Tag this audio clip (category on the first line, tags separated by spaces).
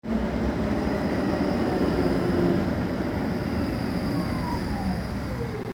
Soundscapes > Urban
streetcar tram transport